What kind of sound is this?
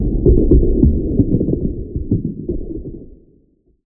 Sound effects > Electronic / Design
A series of underwater Explosions, Rather Small explosions to be exact and not that Far, made with Pigments via Studio One, The audio was made using a sample of Rocks Debris